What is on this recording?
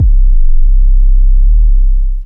Instrument samples > Synths / Electronic
CVLT BASS 177
sub, subs, wavetable, bass, drops, bassdrop, wobble, lowend, stabs, clear, synth, subbass, subwoofer, low, lfo, synthbass